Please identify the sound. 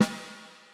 Music > Solo percussion
Snare Processed - Oneshot 215 - 14 by 6.5 inch Brass Ludwig
processed, snare, drum, brass, rimshot, drums, beat, kit, hit, ludwig, drumkit, rim, roll, oneshot, realdrum, snares, perc, reverb, snareroll, realdrums, rimshots, snaredrum, flam, hits, acoustic, fx, sfx, percussion, crack